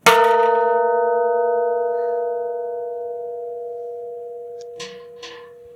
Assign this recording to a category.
Sound effects > Objects / House appliances